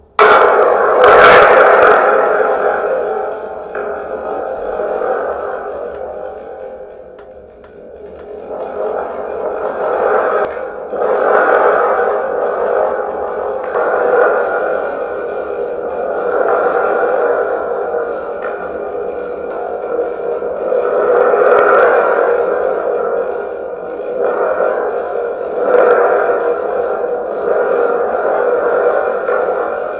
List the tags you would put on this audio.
Music > Solo percussion
thunder-tube Blue-Snowball crash cartoon

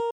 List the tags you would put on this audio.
Instrument samples > String
arpeggio cheap design guitar sound stratocaster tone

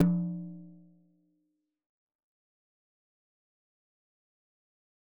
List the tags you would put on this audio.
Music > Solo percussion
hitom toms rimshot drum acoustic tom flam roll percussion studio beatloop perc instrument beats kit drumkit percs velocity hi-tom rim oneshot tomdrum beat fill drums

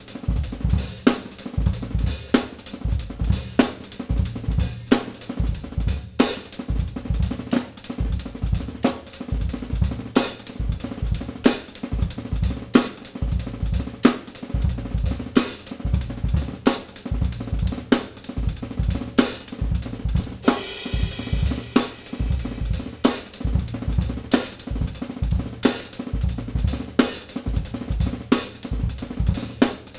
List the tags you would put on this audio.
Music > Solo percussion
Drums-Sample Drum-Set Studio-Drum-Set

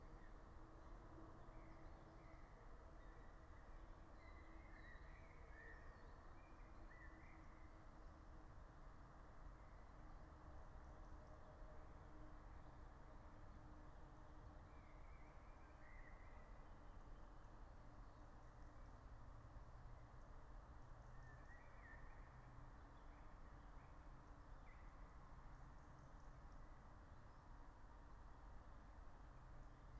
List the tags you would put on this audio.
Soundscapes > Nature
soundscape raspberry-pi phenological-recording field-recording meadow natural-soundscape alice-holt-forest nature

